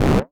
Sound effects > Experimental
Analog Bass, Sweeps, and FX-009
alien, analog, analogue, bass, bassy, complex, dark, electro, electronic, fx, korg, machine, mechanical, pad, retro, robot, robotic, sfx, snythesizer, sweep, trippy, vintage, weird